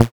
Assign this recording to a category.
Instrument samples > Synths / Electronic